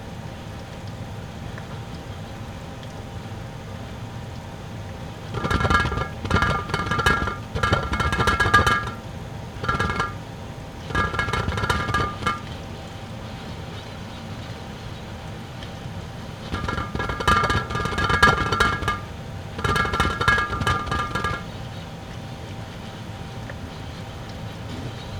Sound effects > Objects / House appliances
Subject : A cooking pan with a lid (probably to jar some stewed fruit), bobbing as the steam goes out. Date YMD : 2025 September 08 Location : Gergueil 21410 Bourgogne-Franche-Comté Côte-d'Or France. Sennheiser MKE600 with stock windcover P48, no filter. Weather : Processing : Trimmed and normalised in Audacity.